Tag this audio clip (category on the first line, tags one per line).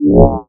Instrument samples > Synths / Electronic
fm-synthesis
additive-synthesis